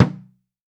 Sound effects > Objects / House appliances
Big plastic bottle
A single-hit of a large plastic bottle with a drum stick. Recorded on a Shure SM57.
percussive, single-hit, plastic, hit, percussion